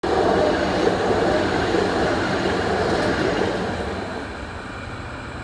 Soundscapes > Urban
The sound of a passing tram recorded on a phone in Tampere
Field-recording, Railway, Tram